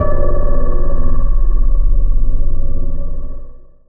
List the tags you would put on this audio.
Instrument samples > Synths / Electronic
bass
bassdrop
clear
drops
lfo
low
lowend
stabs
sub
subbass
subs
subwoofer
synth
synthbass
wavetable
wobble

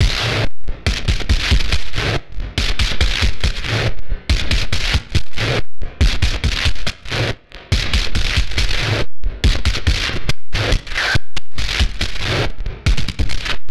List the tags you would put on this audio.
Music > Solo percussion
industrial
percussion-loop
distortion
drum-loop
hard
140-bpm

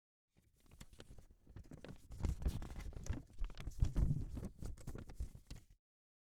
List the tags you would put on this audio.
Sound effects > Other
page
origami
paper
crumple

